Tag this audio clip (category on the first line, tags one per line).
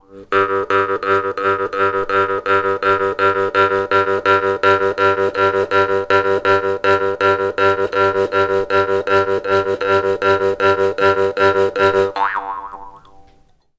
Instrument samples > Percussion
trompe
South
instrument
recording
Chile
America
Valparaiso
field